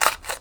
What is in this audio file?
Sound effects > Objects / House appliances
PAPRImpt-Blue Snowball Microphone, CU Pencil, Stab In, Out of Paper Nicholas Judy TDC
A pencil stabbing in and out of paper.
out, Blue-Snowball, paper, stab, pencil, Blue-brand